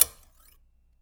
Sound effects > Objects / House appliances
knife and metal beam vibrations clicks dings and sfx-053
Beam, Clang, ding, Foley, FX, Klang, Metal, metallic, Perc, SFX, ting, Trippy, Vibrate, Vibration, Wobble